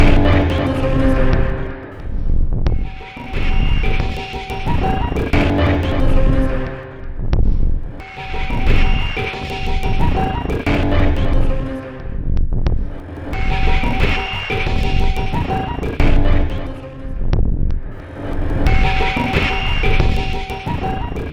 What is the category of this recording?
Instrument samples > Percussion